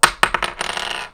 Sound effects > Objects / House appliances
FOLYProp-Blue Snowball Microphone, CU Seashell, Clatter 03 Nicholas Judy TDC
Blue-brand, Blue-Snowball, clatter